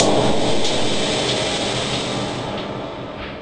Sound effects > Electronic / Design
Impact Percs with Bass and fx-006
low, foreboding, combination, cinamatic